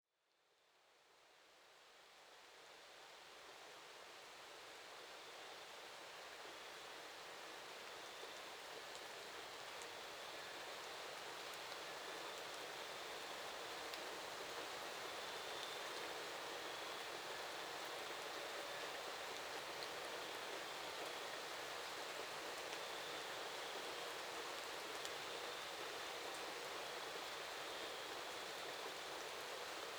Soundscapes > Nature
Inland Nor'easter Wind and Rain
An hour of sounds from an approaching Nor'easter. It's mainly wind and rain with some crickets chirping in the background. My downstairs neighbors windchimes can be heard occasionally. I would have recorded more audio but wind driven rain was beginning to enter the condo unit! Recorded with a Zoom H6 Essential audio recorder. The recorder was placed indoors on top of a cheap Ikea table located behind a screen door. A small "camera umbrella" was used for rain shielding. Audio edited with Sony Vegas Pro 22.0 (Yes, it can edit audio) and AVS Audio editor.
nature, Noreaster, rain, weather, wind